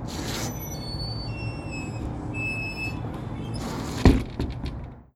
Sound effects > Objects / House appliances
Mario's Italian Restaurant door opening, squeaking and closing. Exterior perspective.